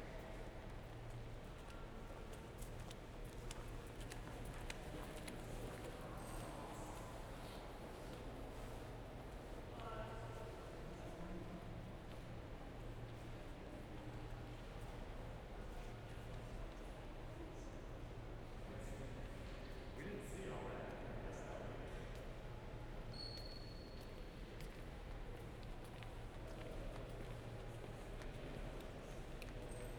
Sound effects > Human sounds and actions
Museum ambience - Washington DC National Gallery of Art
Museum ambience of the national Gallery of Art in Washington DC. People passing by, conversations, lots of room reverb in different sized rooms, including an atrium at the beginning. Stereo ORTF
gallery, Room, Ambience, reverb, Ambiance, echo, museum